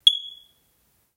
Sound effects > Objects / House appliances
Smoke Detector Chirp 2
A smoke alarm chirp heard in a Distance to the microphone. This was recorded on an iPhone 11.
beep, Electronic, smoke-alarm, smoke-detector